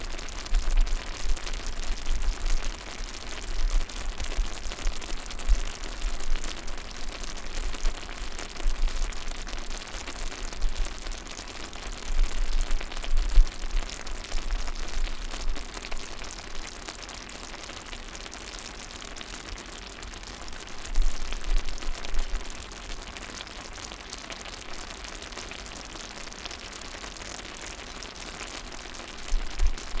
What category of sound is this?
Sound effects > Objects / House appliances